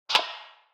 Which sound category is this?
Sound effects > Human sounds and actions